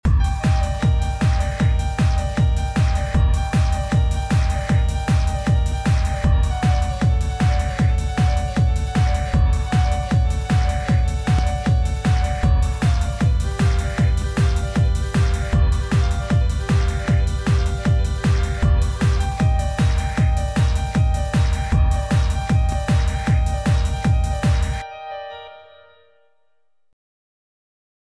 Music > Multiple instruments
music beats 4
Music song track with beats .
stabs, Drum, Ace, Ambiance, sample, Drums, atmosphere, Rhythm, Instrument, music, Loop, Melody, Piano